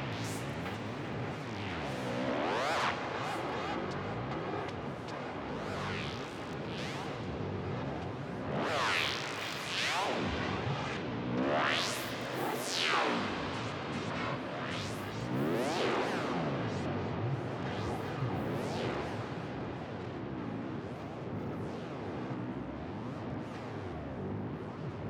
Music > Solo instrument
electric atm make with cardinal synth with osc terrorform , shapemaster , liquid and plateu modules